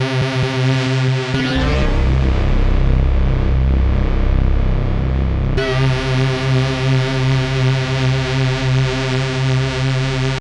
Instrument samples > Synths / Electronic
CVLT BASS 79
bass, bassdrop, clear, drops, lfo, low, lowend, stabs, sub, subbass, subs, subwoofer, synth, synthbass, wavetable, wobble